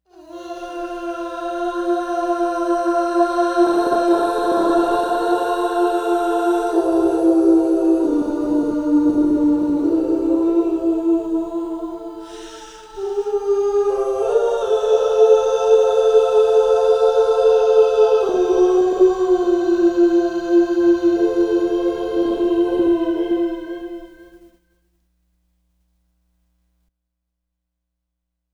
Music > Solo instrument
Angelic Vocals FX
A dreamy angelic vocal sound produced in my studio using a Sure Beta57a Mic through the TC Helicon VoiceLive 2, and the RC 600 Loop Station, and further processed in Reaper with Fab Filter and some other VSTs
ambience, angel, atmos, choir, nice, reverb, sfx, sweet, vocals